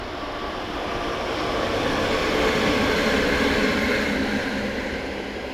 Sound effects > Vehicles
Tram 2025-10-27 klo 20.13.02 97
Public-transport, Tram